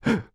Speech > Solo speech
Fear - Gasp2
dialogue, fear, FR-AV2, gasp, Human, inhale, Male, Man, Mid-20s, Neumann, NPC, oneshot, singletake, Single-take, talk, Tascam, U67, Video-game, Vocal, voice, Voice-acting